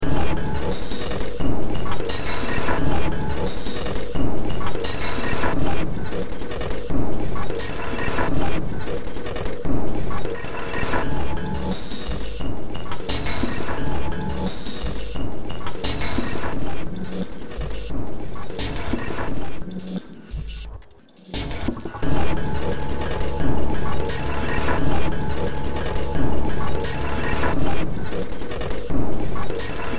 Music > Multiple instruments
Demo Track #3214 (Industraumatic)
Ambient, Cyberpunk, Games, Horror, Industrial, Noise, Sci-fi, Soundtrack, Underground